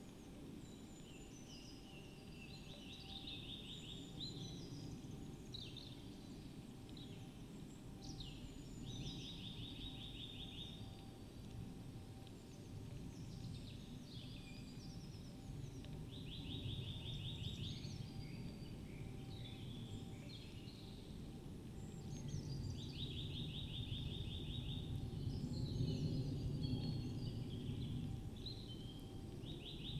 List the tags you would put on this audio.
Soundscapes > Nature
alice-holt-forest artistic-intervention data-to-sound field-recording modified-soundscape natural-soundscape nature raspberry-pi sound-installation soundscape weather-data

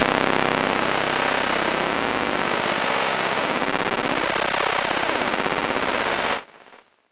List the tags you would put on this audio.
Instrument samples > Synths / Electronic
subbass sub lowend wavetable lfo subwoofer bassdrop bass stabs low wobble drops synth synthbass subs clear

